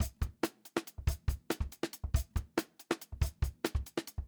Music > Solo percussion
Short pitched loop 140 BPM in 5 over 8
Drum loop sample from recent studio session
drums, kit, live, recording, studio